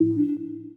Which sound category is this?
Sound effects > Electronic / Design